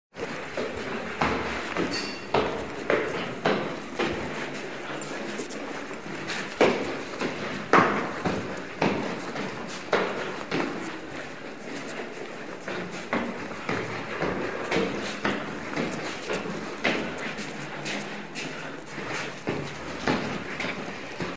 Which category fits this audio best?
Sound effects > Human sounds and actions